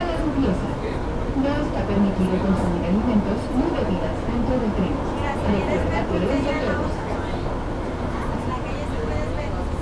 Soundscapes > Urban
Announcement to passengers that consuming food or beverages on the train is not permitted. This is the light urban train system in Guadalajara, México.